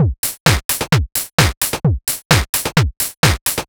Music > Solo percussion
8 bit-Sample Preview Drum Loops1
All sounds synthed with phaseplant only.
percussion, 8-bit, FX, Preview, game, Loops